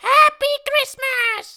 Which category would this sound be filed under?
Speech > Solo speech